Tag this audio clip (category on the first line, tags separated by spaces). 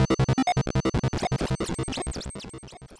Sound effects > Electronic / Design
Arcade games gaming